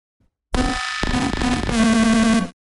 Sound effects > Electronic / Design
Optical Theremin 6 Osc Shaper Infiltrated-016
Machine, Noise, IDM, Electronic, Weird, Tone, Chaotic, FX, strange, SFX, Mechanical, Synth, Otherworldly, Oscillator, Robot, DIY, Crazy, Electro, Analog, Saw, Pulse, EDM, Loopable, Experimental, Gliltch, Theremin, Impulse, Robotic, Alien